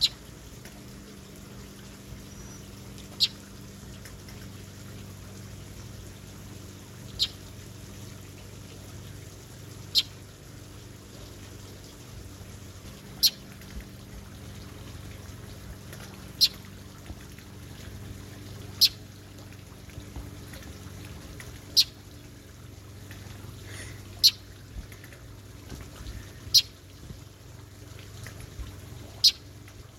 Sound effects > Animals
BIRDSong-Samsung Galaxy Smartphone, CU Northern Mockingbird, Single Chirps, Multiple Times Nicholas Judy TDC
A single northern mockingbird chirps multiple times. Recorded in Orlando, Florida.
arkansas, chirp, florida, mississippi, multiple, northern-mockingbird, orlando, Phone-recording, tennessee, texas